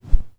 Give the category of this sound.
Sound effects > Electronic / Design